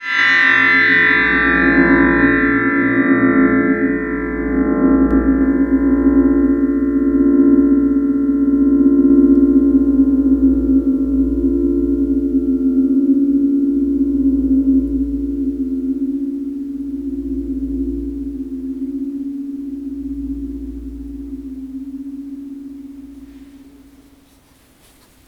Soundscapes > Nature
The resonant sound of a large car spring after being struck by a hammer. Long, multi-timbral, resonant tail, 25 seconds.